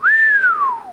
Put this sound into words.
Sound effects > Human sounds and actions
Surprised Whistle
Some dude whistling in a surprised tone. I recorded this myself.